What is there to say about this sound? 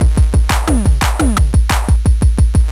Music > Solo percussion
Sounds made with The Bleep Drum, an Arduino based lo-fi rad-fi drum machine
Drums, Clap, Lo-Fi, Snare, Analog, Electronic, Drum, Circuit-Bend, Bleep, Loop, Kick, Hi-Hats